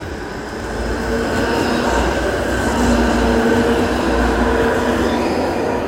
Sound effects > Vehicles
tram-apple-8
tramway, tram, vehicle, outside